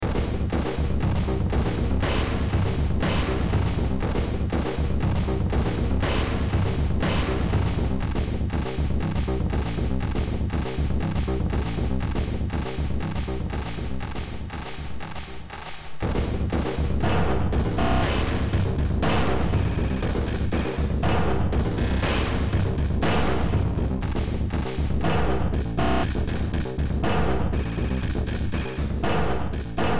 Music > Multiple instruments
Demo Track #3075 (Industraumatic)
Ambient, Cyberpunk, Games, Horror, Industrial, Noise, Sci-fi, Soundtrack, Underground